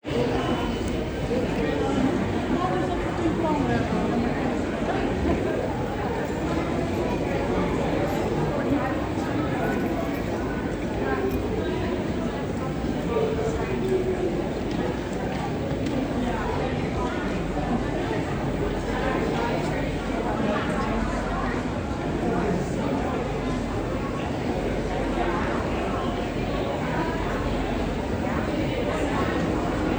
Soundscapes > Indoors
Walla people Dutch intern shopping mall Hoog Catharijne 2026-01 HZA
iPhone 6 stereo recording of walla of Dutch crowd in a large shopping mall (Hoog Catharijne) in Utrecht, the Netherlands.
Dutch, talking, crowd, shop, people, ambience, walla, chatter, voices